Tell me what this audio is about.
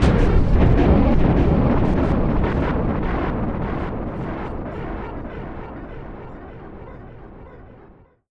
Sound effects > Electronic / Design
Fantasy Implosion
The wizard creates an anomaly within 10 ft range which consumes all living beings within 20 ft range and draws them to a chaotic evil plane determined by the DM. Yes, this includes the spellcaster themselves. Created by layering multiple piano notes and drums at the same time in FL Studio and passing the output through Quadrant VST. This was made in a batch of 14, many of which were cleaned up (click removal, fading, levelling, normalization) where necessary in RX and Audacity:
arcane, arcane-eye, bend, blast, chaos, chaotic, cloning, collapse, color-spray, confusion, corrupted, dark, detect-thoughts, dimensional, disintegration, drain, evil, forbidden, game, illusion, mage-hand, magic, magic-missile, magic-spell, psychic, rift, rpg, spell, spellcasting, void